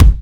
Instrument samples > Percussion
kick Tama Silverstar Mirage 22x16 inch 2010s acryl bassdrum - kickfat 6
BEWARE: Test the drumsamples with music.
bass, death-metal, kick, mainkick, percussive, pop, rock, trigger